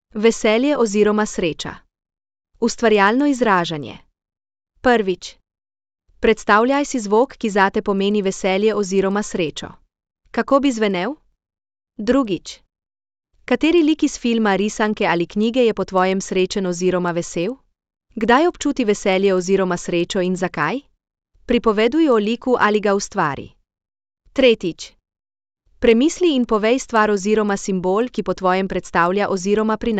Speech > Solo speech
5. VESELJE - Ustvarjalno izražanje
women, speech, questions, emotions, cards, happiness. The sounds were created using the WooTechy VoxDo app, where we converted the text with questions into an audio recording.
questions, happiness, women, emotions, cards, speech